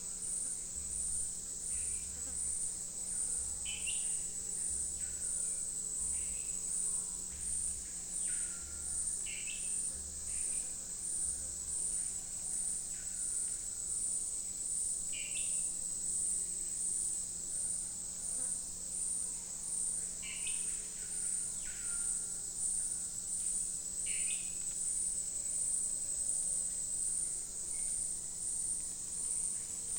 Soundscapes > Nature
AMBTrop-Zh6 Ambience, cricrió, cicadas, forest, next to Cabana Tucuxi, distant music FILI URPRU
Ambiência. Tropical. Canto de pássaros, grilos, estalo de galhos, cricrió, música distante. Gravado próximo à Cabana Tucuxi, Novo Airão, Amazonas, Amazônia, Brasil. Gravação parte da Sonoteca Uirapuru. Em stereo, gravado com Zoom H6. // Sonoteca Uirapuru Ao utilizar o arquivo, fazer referência à Sonoteca Uirapuru Autora: Beatriz Filizola Ano: 2025 Apoio: UFF, CNPq. -- Ambience. Tropical. Birds chirp, crickets,, twigs snap, faint music, cricrió. Recorded next to Cabana Tucuxi, Novo Airão, Amazonas, Amazônia, Brazil. This recording is part of Sonoteca Uirapuru. Stereo, recorded with the Zoom H6. // Sonoteca Uirapuru When using this file, make sure to reference Sonoteca Uirapuru Author: Beatriz Filizola Year: 2025 This project is supported by UFF and CNPq.
amazonia, ambience, bird, brazil, chirp, crickets, cricrio, faint, field, field-recording, leaves, music, nature, park, river, sonoteca-uirapuru, soundscape